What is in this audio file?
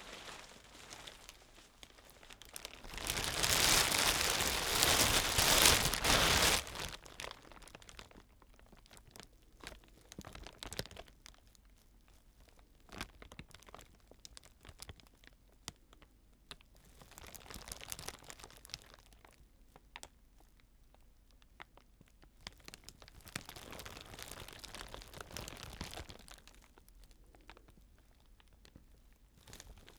Sound effects > Human sounds and actions
RAINCOAT FOLEY AKG-C414 02
Unprocessed, Clean Thin-Sheet plastic polythene poncho Raincoat foley with various movements recorded in studio with an AKG-C414 XL- II condenser microphone (Cardioid Configuration). Recording done in Reaper 7.42. Recording is done very close to the microphone, so you may have to do a highpass and reduce volume for most purposes. Recommended use is to take small bits from the longer sound to suggest movement. Recorded at the World University of Design sound studio, Sonipat, Delhi NCR, India.
crumple, foley, plastic, polythene, poncho, raincoat